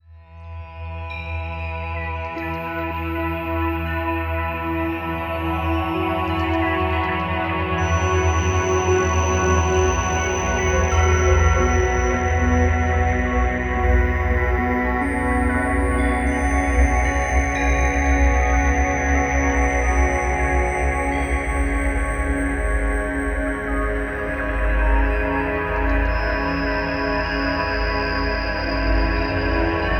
Music > Other
Monastery atmosphere - Monk chant + chimes
Mystical and stern monastic atmosphere. I wanted to create a sense of gravity and sacredness. A kind of prayerful atmosphere on top of a snowy mountain, in the main chamber of a lava stone monastery. I wanted a melody that was at once intriguing, all-encompassing, and austere, set against a rich, ample backdrop. DAW : Garageband. No pre-made loops. VSTs, Garageband plug-ins : Alpha waves. Instruments : Celestial voices, Mystic Timepiece (rythmic synthesizer), Tuned gongs. BPM 110 (but the track hasn't been processed with quantization). Key : Am * Music and experimental sounds.
abbey, ascetic, austere, bell, bells, cathedral, cemetery, chime, choir, church, clanging, clock, cloister, dong, fantasy, gong, grave, himalaya, male, masculine, monastery, monk, mountain, mystery, ring, sacred, sanctuary, shrine, stern, temple